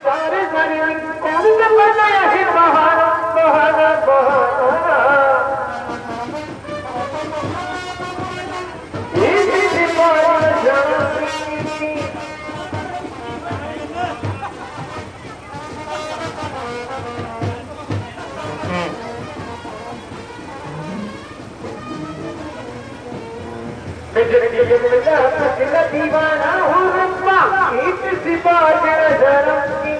Soundscapes > Urban
India Bride (corteo starting)
Sound recorded in India that explores the loudness produced by human activity, machines and environments on cities such as New Delhi, Mumbay and Varanasi.